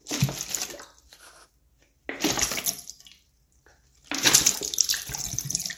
Sound effects > Natural elements and explosions
GOREBlood Water Bottle Spilling Hard, Bloody, Splash, Splat Nicholas Judy TDC
Blood sound effects using a water bottle spilling hard.
blood, foley, gore, Phone-recording, splash, splat, wet